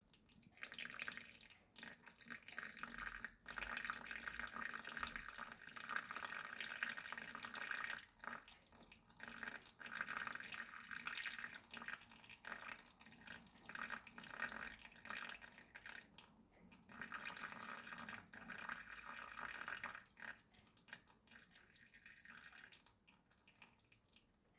Sound effects > Objects / House appliances
Watering Can in a Potted Plant
the sound of carefully watering a potted plant
Splashing, Watering, Water